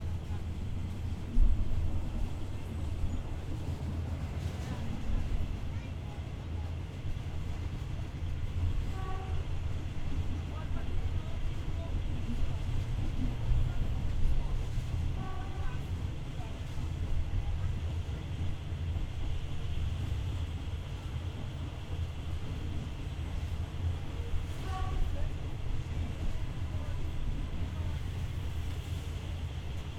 Soundscapes > Other
Train Ride, Bangkok, Thailand (Feb 24, 2019)
Sounds recorded on a train in Bangkok. Features rhythmic train movement, background conversations, and ambient city sounds.